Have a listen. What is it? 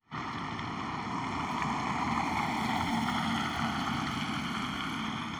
Sound effects > Vehicles
car passing 8
car, drive, vehicle